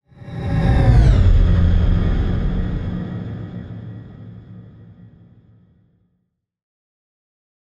Sound effects > Other
Sound Design Elements Whoosh SFX 033
trailer, movement, transition, film, ambient, effect, production, design, fx, cinematic, dynamic, sweeping, sound, element, audio, whoosh, effects, elements, fast, swoosh, motion